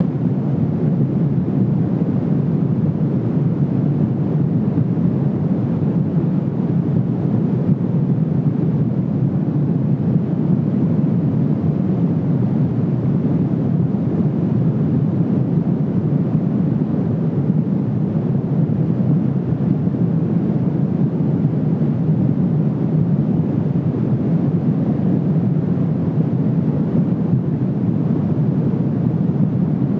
Sound effects > Electronic / Design
Engine Sound
Could be used as a car engine FX. This sound was not created using A.I. Created using a Reason 12 synthesizer.
gaming, engine, travel